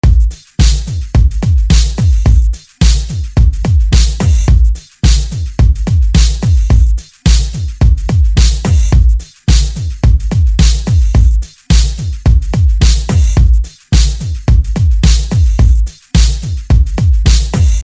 Instrument samples > Percussion
Ableton Live. VST......Fury-800.......Drums 108 bpm Free Music Slap House Dance EDM Loop Electro Clap Drums Kick Drum Snare Bass Dance Club Psytrance Drumroll Trance Sample .
108, Bass, bpm, Clap, Dance, Drums, EDM, Electro, House, Kick, Music, Slap, Snare